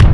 Instrument samples > Percussion
taiko tom 5
bass, bass-drum, bassdrum, basskick, beat, dance, death-metal, drum, drums, fill, fill-tom, filltom, floor-1, heavy, kick, mainkick, megafloor, metal, percussion, pop, powerful, rhythm, rock, strong, taiko, taikoid, tom, tom-tom, unsnared, wadaiko